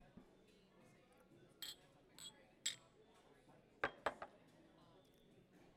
Sound effects > Objects / House appliances
Shots clink down
Shot glasses clink, put down on table.
table, clink, glasses